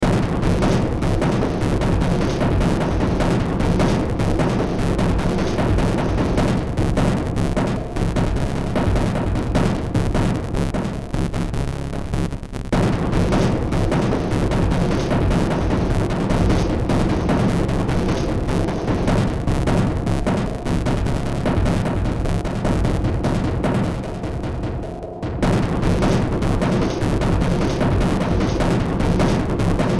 Music > Multiple instruments
Short Track #3001 (Industraumatic)
Games, Industrial, Noise, Sci-fi, Soundtrack, Underground